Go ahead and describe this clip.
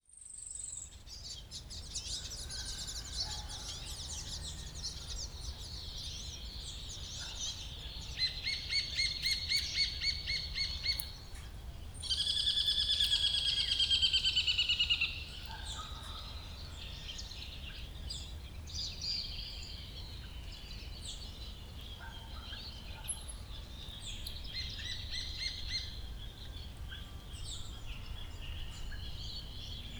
Soundscapes > Nature
Al amanecer (At dawn)
Antes del amanecer en el bosquecito Matero, cerca de las 7:40 am del 16 de abril de 2025. El registro fue realizado con ZOOM H6, usando la cápsula MS (Mid-Side). Luego fue editado con Audacity 3.7.3. Before dawn in the Matero grove, around 7:40 a.m. on April 16, 2025.. The recording was carried out with ZOOM H6, using the MS (Mid-Side) capsule. It was then edited with Audacity 3.7.3. No hay cosa más capaz de hacernos calar en el conocimiento de la miseria humana, como el considerar la verdadera causa de la agitación continua, en que pasan los hombres toda su vida. El alma es encerrada en el cuerpo, para hacer en él una mansión de poca duración. Sabe que no es más que un paso, para un viaje eterno, y que no tiene más que lo poco que dura la vida para prevenirse a él. Las necesidades de la naturaleza le roban una gran parte. No le queda sino muy poco de que poder disponer.